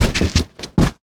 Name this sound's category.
Sound effects > Human sounds and actions